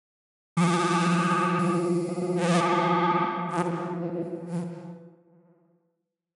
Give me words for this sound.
Sound effects > Electronic / Design
Optical Theremin 6 Osc Shaper Infiltrated
Spacey, Digital, Otherworldly, Infiltrator, Noise, Handmadeelectronic, FX, SFX, Scifi, Robot, Dub, Sci-fi, Theremin, Glitchy, Bass, Robotic, DIY, Electro, Glitch, Electronic, Alien, Sweep, Synth, Optical, Analog, Trippy, noisey, Instrument, Theremins, Experimental